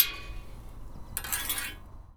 Sound effects > Objects / House appliances
Junkyard Foley and FX Percs (Metal, Clanks, Scrapes, Bangs, Scrap, and Machines) 44
FX, Clang, Robotic, Metallic, Bang, Foley, dumpster, Atmosphere, dumping, Clank, Metal, waste, Junkyard, garbage, rattle, Junk, scrape, rubbish, tube, Environment, Smash, Percussion, Bash, Dump, Machine, Ambience, Perc, Robot, SFX, trash